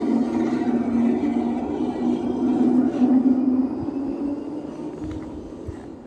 Sound effects > Vehicles
final bus 17
hervanta, finland